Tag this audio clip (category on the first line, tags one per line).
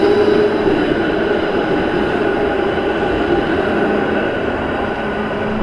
Sound effects > Vehicles
vehicle
field-recording
winter
transportation
city
tramway
tram